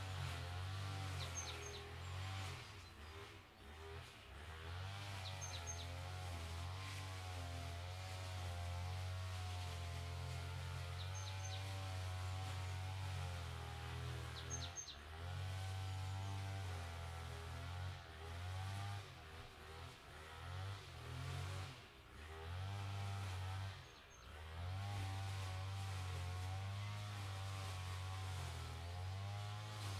Soundscapes > Nature
24h ambiance pt-09 - 2025 04 16 15h00 - 18H00 Gergueil Greenhouse
Subject : One part out of ten of a 24h MS recording of Gergueil country side. Recorded inside a Greenhouse. Date YMD : Project starting at 20h20 on the 2025 04 15, finishing at 20h37 on the 2025 04 16. Location : Gergueil 21410, Côte-d'Or, Bourgogne-Franche-Comté. Hardware : Zoom H2n MS, Smallrig Magic-arm. At about 1m60 high. Weather : Rainy, mostly all night and day long. Processing : Trimmed added 5.1db in audacity, decoded MS by duplicating side channel and inverting the phase on right side. (No volume adjustment other than the global 5.1db).
2025, 21410, April, Bourgogne-Franche-Comte, Cote-dor, country-side, field-recording, France, Gergueil, green-house, H2N, late-afternoon, Mid-side, MS, plastic, rain, raining, rain-shower, Rural, weather, windless, Zoom-H2N